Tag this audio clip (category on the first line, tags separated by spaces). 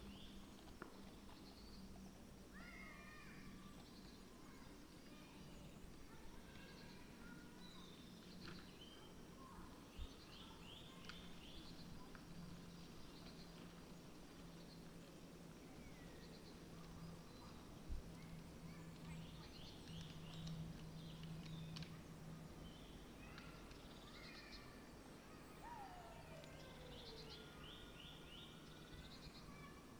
Soundscapes > Nature
nature sound-installation raspberry-pi phenological-recording soundscape weather-data modified-soundscape Dendrophone